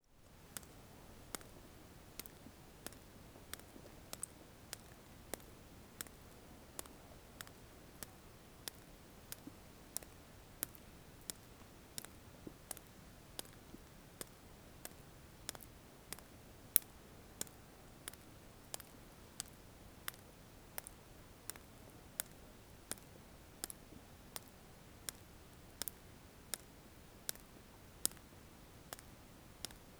Soundscapes > Nature
Quiet afternoon atmospheric recording with water dripping in close proximity, after a rainstorm in the Cycladic island of Tinos, Greece. This audio was recorded outside the monastery of Katapoliani in the Isternia/Pirgos area in November 2025.